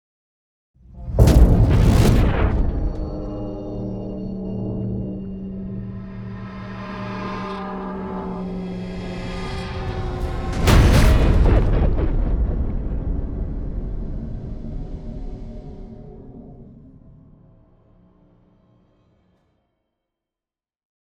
Sound effects > Other
Sound Design Elements SFX PS 067
industrial, movement, video, impact, epic, tension, effect, deep, reveal, game, transition, cinematic, riser, metal, implosion, indent, sweep, whoosh, hit, sub, explosion, bass, stinger, boom, trailer